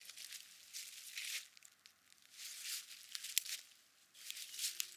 Sound effects > Natural elements and explosions
Grass and leaves rustling
Rustling in autumn leaves and grass. Recorded with a Rode NTG-3.